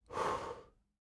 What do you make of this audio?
Speech > Solo speech
Date YMD : 2025 July 29 Location : Indoors France. Inside a "DIY sound booth" which is just a blanket fort with blankets and micstands. Sennheiser MKE600 P48, no HPF. A pop filter. Speaking roughly 3cm to the tip of the microphone. Weather : Processing : Trimmed and normalised in Audacity.
Adult, breath, breathing, Calm, exhale, FR-AV2, Generic-lines, Hypercardioid, Male, mid-20s, MKE-600, MKE600, Sennheiser, Shotgun-mic, Shotgun-microphone, Single-mic-mono, Tascam, Voice-acting